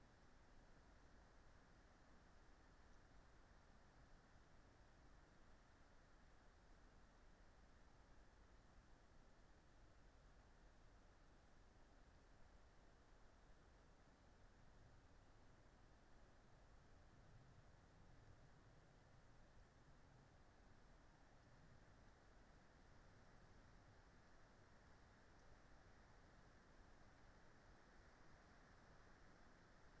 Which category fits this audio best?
Soundscapes > Nature